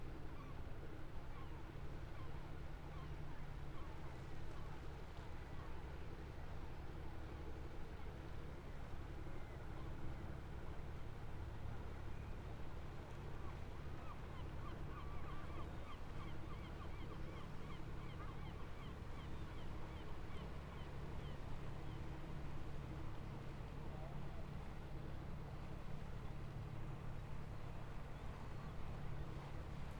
Soundscapes > Urban
BOATMotr Motor boats in the bay with seagulls, evening at the tip of Långö, Karlskrona, Sweden
Recorded 20:48 19/07/25 On the island of Långö, where you can hear seagulls flocking around small islands in the bay of the town. Several motor boats cruise around this evening, sometimes passing in front of the microphone. Once a person with their dog walks past, some bicyclists, and splashing from a moored boat. At times distant motor bikes from the town are heard. Zoom H5 recorder, track length cut otherwise unedited.
Bay, Boats, Cruising, Evening, Field-Recording, Island, Karlskrona, Motor, Passing, Rumble, Sea, Seagulls, Seaside, Sweden, Town, Traffic, Wailing, Water